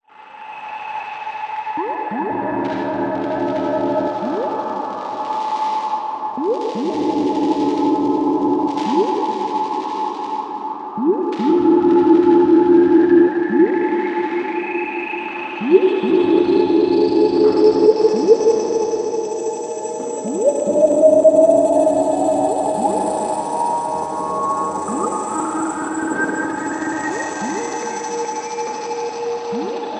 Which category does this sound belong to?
Music > Other